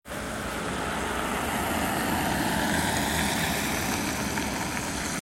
Sound effects > Vehicles
car sunny 09
engine, vehicle